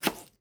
Sound effects > Other
Quick vegetable chop 1
Home, Cook, Knife